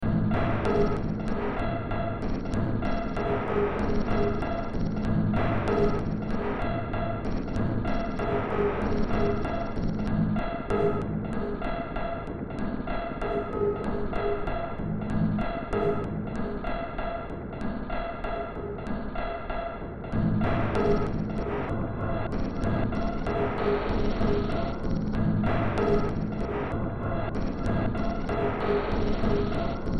Music > Multiple instruments
Demo Track #3551 (Industraumatic)
Noise, Horror, Games, Industrial, Soundtrack, Ambient, Underground, Sci-fi, Cyberpunk